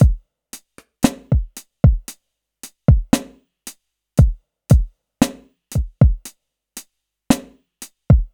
Instrument samples > Percussion
terrible sloppy half tempo drum loop (115bpm)
115bpm, drum, drums, loop, sample, unmixed